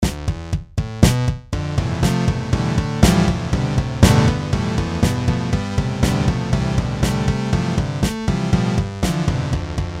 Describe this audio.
Music > Multiple instruments
Synthy notes
Synthy kind of music that is cool, kinda
Fun, Synth, Weird